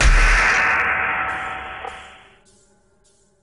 Sound effects > Electronic / Design
Impact Percs with Bass and fx-005
bash, bass, brooding, cinamatic, combination, crunch, deep, explode, explosion, foreboding, fx, hit, impact, looming, low, mulit, ominous, oneshot, perc, percussion, sfx, smash, theatrical